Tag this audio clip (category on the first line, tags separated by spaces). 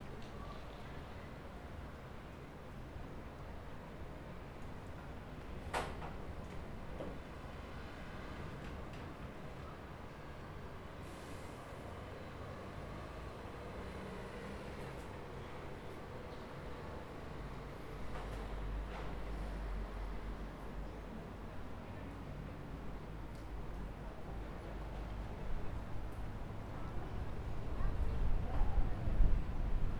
Soundscapes > Urban
city urban